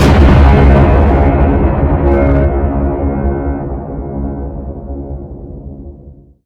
Sound effects > Electronic / Design
The Death Octagon has entered planetary orbit. Impulse destabilizers are at maximum capacity, initiating cross-phase destroturbulence protocols. Infigator arrays locked—planetary annihilation sequence commencing. Created by layering multiple piano notes and drums at the same time in FL Studio and passing the output through Quadrant VST. This was made in a batch of 14, many of which were cleaned up (click removal, fading, levelling, normalization) where necessary in RX and Audacity: